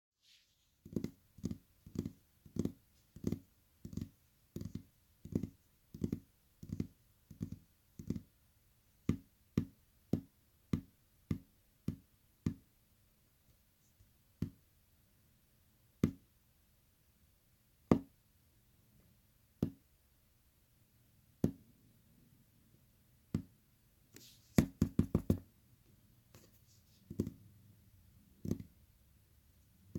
Sound effects > Human sounds and actions
Fingertips tapping on table 01
I recorded my fingers tapping on my desk.
thud, tapping, fingertip, tap, touch, table, knock, finger, wood, hit